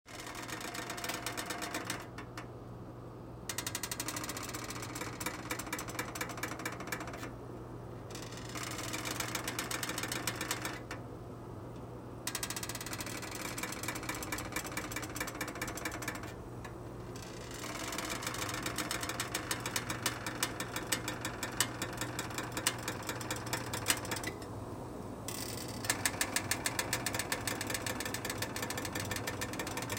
Sound effects > Objects / House appliances
Stovetop Heat Creaking 2
Closeup sound of heat stress on metal from a saucepan on a stovetop range as it heats.
heat, stove, kitchen, metal, stress, range, stovetop